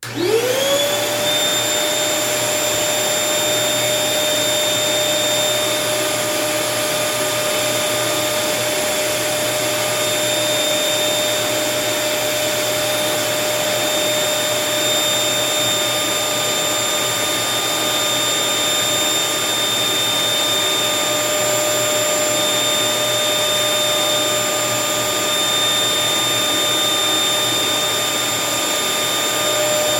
Sound effects > Objects / House appliances
MACHAppl-Samsung Galaxy Smartphone, CU Bissell FeatherWeight Vacuum Turn On, Run, Off Nicholas Judy TDC
A Bissell FeatherWeight vacuum cleaner turning on, running and turning off.
turn-off, vacuum-cleaner, Phone-recording